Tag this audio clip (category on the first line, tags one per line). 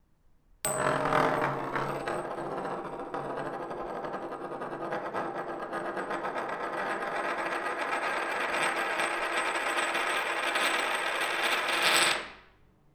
Sound effects > Objects / House appliances
coin
dime
ding
drop
fall
metal
money
penny
quarter
room
spin
spinning
twirl